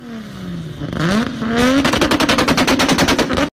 Sound effects > Vehicles
Just a car accelerating
sfx, Vehicle, effect